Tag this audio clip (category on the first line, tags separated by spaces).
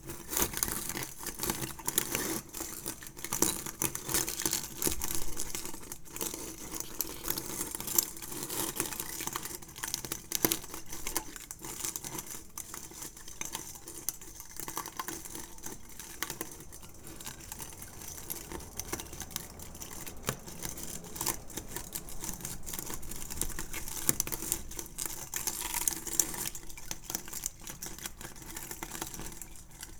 Sound effects > Other mechanisms, engines, machines
sfx Metallic Shop Tool Brushing Brush Scrape Foley Workshop Woodshop fx Tools Household Bristle Mechanical